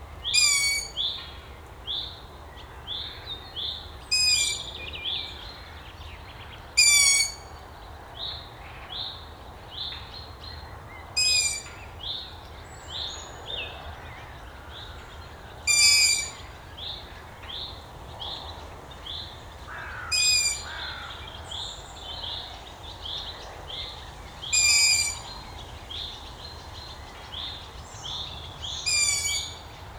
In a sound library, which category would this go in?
Soundscapes > Nature